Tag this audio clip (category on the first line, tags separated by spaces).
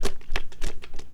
Sound effects > Objects / House appliances
carton clack click foley industrial plastic